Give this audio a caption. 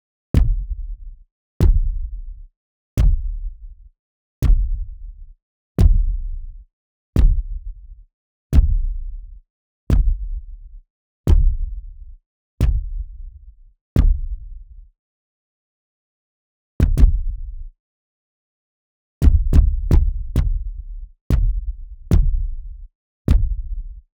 Sound effects > Electronic / Design
Powerful, heavy robot footsteps – fully synthesized with layered metallic impacts, low-end thuds. Designed to convey immense weight and industrial strength, ideal for sci-fi games, cinematic trailers, or robotic character movement in animations and VR experiences. If you enjoy this sound, you can support my work by grabbing the full “Robot Skeleton SFX” pack on a pay-what-you-want basis (starting from just $1)! Your support helps me keep creating both free and commercial sound libraries for game devs, animators, and fellow audio artists. 🔹 What’s included? – A full set of unique, fully synthesized heavy robot footsteps – Bonus: 1 ready-to-use Reason Combinator patch for instant variation, layering, and real-time tweaking 💛 Even $1 makes a real difference — it fuels more weird, wonderful, and highly usable sounds like this one.